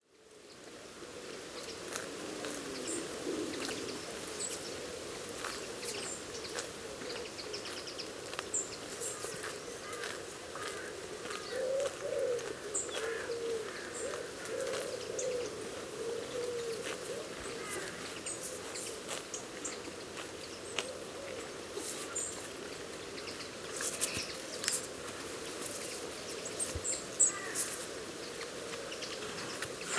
Soundscapes > Nature
Horse Grazing and Snorting
Horse grazing on grass in a small field. The horse can be heard 'snorting' in the second half of the recording. Birds can be heard, including a common woodpigeon flying past as well as calling. Warm sunny day in the high 20s Celsius with some light wind blowing through adjacent trees and bushes. Recorded with a Zoom F6 and Clippy mic in a parabolic reflector.
grazing, horse, horse-grazing, snorting, ZoomF6